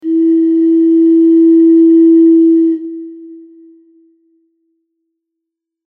Instrument samples > Other
A long low-pitched note produced by blowing on an almost empty bottle. With reverb effect.
music, experimental, calm, note, instrumental, low, lower-pitch, wind, blow, bottle, noise, instrument, lower, traditional, hum, low-pitch, intrumental